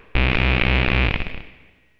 Instrument samples > Synths / Electronic
Benjolon 1 shot29
CHIRP, 1SHOT, NOISE, MODULAR, SYNTH, DRUM